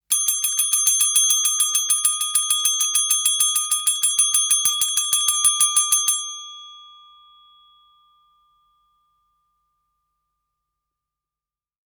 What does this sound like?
Sound effects > Objects / House appliances
They nervously ring the reception bell
Calling hotel staff by bell. Recorded in a recording studio. Please tell us how you plan to use this recording.
hall, reception, hotel, call